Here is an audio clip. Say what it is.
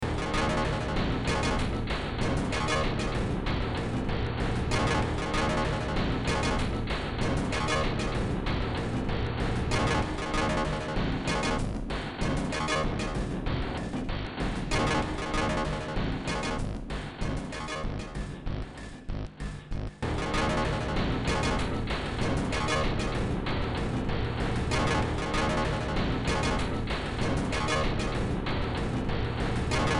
Music > Multiple instruments
Demo Track #3912 (Industraumatic)
Games, Horror, Noise, Soundtrack, Underground